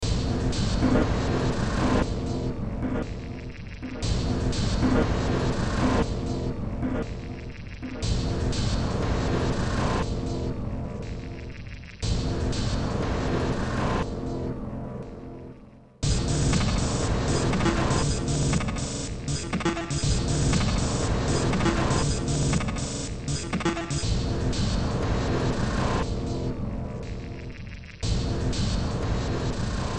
Music > Multiple instruments
Demo Track #3086 (Industraumatic)
Games, Noise, Cyberpunk, Industrial, Soundtrack, Sci-fi, Underground, Horror, Ambient